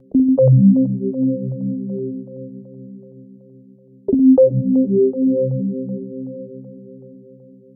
Sound effects > Experimental
Granular Blip Blop Low Tone at 120bpm
Recording of the blop from my mouth processed with a granulary synthesizer (Torso S4) and delay